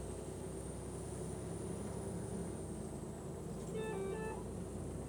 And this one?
Sound effects > Vehicles
AmbienceTransportation Bus stop alert Mexico city no voices NMRV FCS2
mexico bus alert arriving sign
stop, alert, Bus